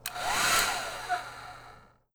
Sound effects > Objects / House appliances
MACHAppl-Blue Snowball Microphone Hair Dryer, Turn On, Run, Turn Off, High, Short Nicholas Judy TDC
A short hair dryer turning on, running at high and turning off.